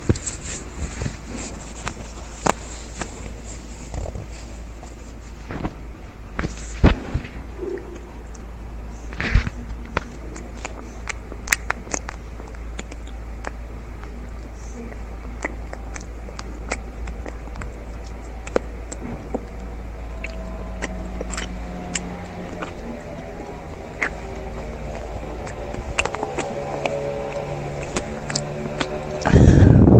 Sound effects > Human sounds and actions
MASTURBATION AMATEUR
MASTURBATE MY WET PUSSY there is only sound but very exciting for you I'm really excited that you're listening to me masturbate on the phone in secret. I AM A VIRGIN Big Tits,Masturbate,masturbated Masturbation,PussyShaved,Pussy,Sucking,Solo,Real Orgasm,amateur
amateur, masturbation, orgasm, pussy